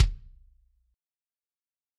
Instrument samples > Percussion
Kickdrum sample ready to use in the Roland TM-2. This is an own recorded sample.
drumkit, drums, kick, kickdrum, sample, trigger